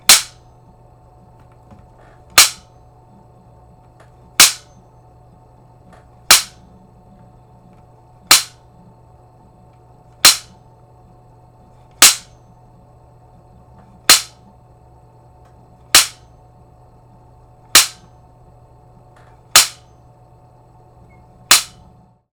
Sound effects > Objects / House appliances
TOONImpt-Blue Snowball Microphone, CU Slapstick, Slap, Human Slap, Whip, Real Nicholas Judy TDC
A slapstick slap. Use for real human slap or whip.